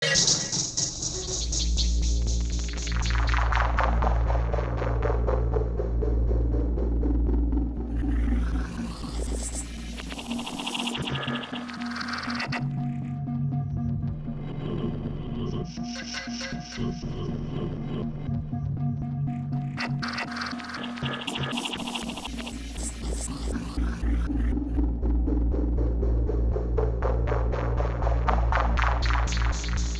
Soundscapes > Synthetic / Artificial
Granular Dark Ambient Background Texture #001
Dark ambient granular background texture. Made with Digitakt 2. Sample is from the factory library of the amazing SpaceCraft granular synthesizer app,
soundscape, dark, ambient, granular, suspence